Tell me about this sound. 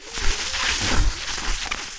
Sound effects > Other
spell fire b
12 - Average Fire Spells Foleyed with a H6 Zoom Recorder, edited in ProTools
medium, spell, fire